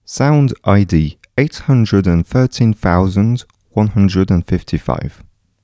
Speech > Solo speech
Saying the sound ID of this sound. Used a Shure Sm57 and A2WS windshield. And a Tascam FR-AV2 Applied a compressor and limiter.